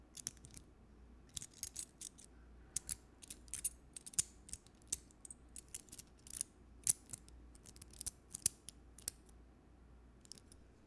Sound effects > Objects / House appliances
Sound of keys rustling at random speed and rhythm. Recorded on a sm57